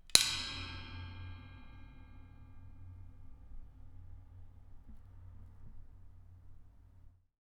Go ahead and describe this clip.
Sound effects > Objects / House appliances
Hitting metal staircase 6
Echo, Metal, Metallic, Staircase, Stairs